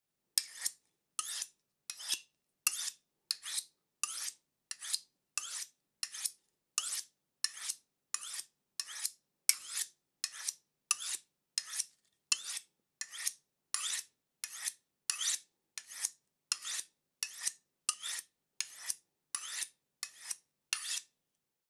Sound effects > Objects / House appliances
Sharpening a knife with a musat 4
Sharpening a knife with a musat. Recorded in a real kitchen on Tascam Portacapture X8. Please write in the comments where you plan to use this sound. I think this sample deserves five stars in the rating ;-)
restaurant, sharp, sharpening, kitchen, cutting, cooking, ceramics, food, knife, steel, tool, blade, campus, metal, edge, clinking, cut, cook